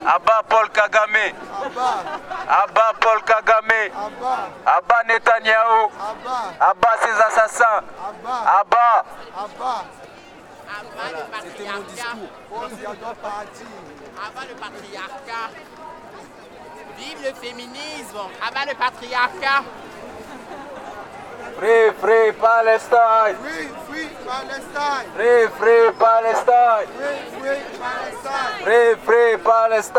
Soundscapes > Urban
Manifestation in Brussels / A bat paul kagame
13 th of February 2025, Brussels : national manifestation / demonstration for public services and purchasing power 13 février 2025, Bruxelles : manifestation / grève nationale pour les services publics et le pouvoir d'achat Recorded with Microphone = Sanken CMS-50 (MS) decoded in STEREO Recorder = Sound Devices MixPre 3 I REF = 25_02_13_12_46